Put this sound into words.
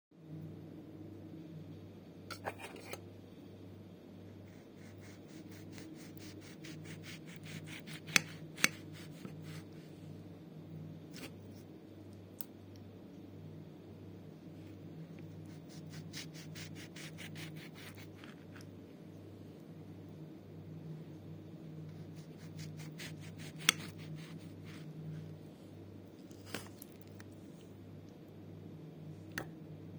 Sound effects > Natural elements and explosions
cutting a steak
For cutting steak or fruit sound effect
steak, Cuttingfood